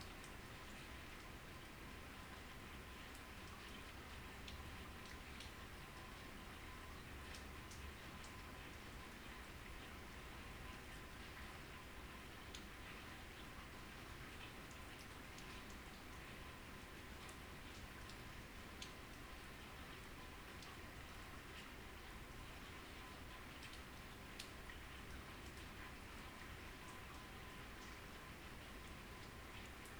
Sound effects > Natural elements and explosions
Outside Rain 2
Falling rain and gutter dripping sounds from inside house.
Gutterdrips, Interior, Rain